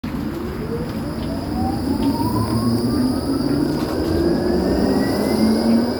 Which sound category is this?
Sound effects > Vehicles